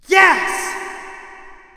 Speech > Solo speech
Money Makers Yes Vocal
Male voice saying "yes" enthusiastically. Personal recording. Recorded on a Blue Snowball.
male, speech, vocal, voice, yes